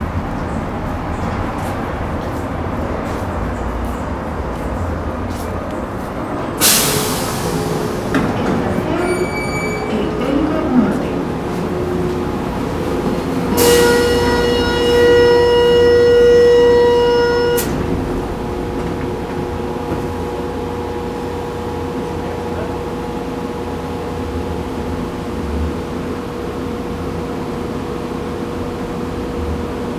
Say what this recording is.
Soundscapes > Urban
Public address system announcing arrival to Periférico Norte train station in Guadalajara, México.
soundscape, train, urban, Guadalajara, Announcement, field-recording